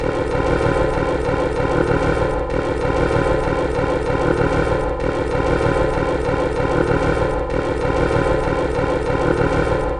Instrument samples > Percussion
This 192bpm Drum Loop is good for composing Industrial/Electronic/Ambient songs or using as soundtrack to a sci-fi/suspense/horror indie game or short film.
Industrial, Loop, Weird, Ambient, Alien, Packs, Samples, Soundtrack, Loopable, Underground, Drum, Dark